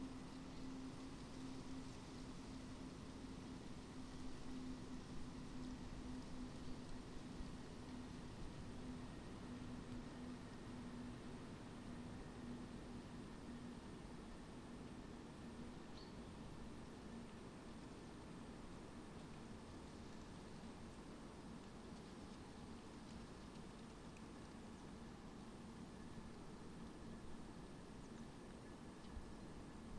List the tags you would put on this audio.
Soundscapes > Nature
sound-installation
raspberry-pi
alice-holt-forest
modified-soundscape
artistic-intervention
Dendrophone
nature
phenological-recording
field-recording
natural-soundscape
weather-data
soundscape
data-to-sound

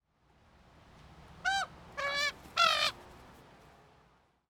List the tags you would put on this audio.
Soundscapes > Nature
field-recording
yukon
nature
whitehorse
ravens
raven
birds
cawing

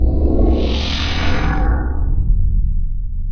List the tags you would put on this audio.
Sound effects > Other mechanisms, engines, machines
artificial
effect
fiction
sfx

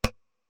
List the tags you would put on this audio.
Sound effects > Electronic / Design
ui
game
interface

